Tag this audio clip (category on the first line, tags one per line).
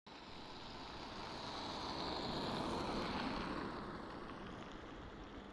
Sound effects > Vehicles
car
automobile
vehicle